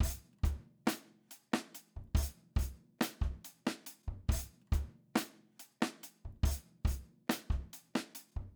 Music > Solo percussion
Short loop 140 BPM in 5 over 4
Drum loop sample from recent studio session
drums, kit, live, loop, recording, studio